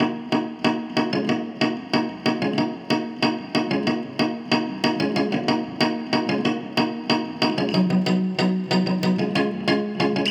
Music > Multiple instruments
guitar chords
chords, guitar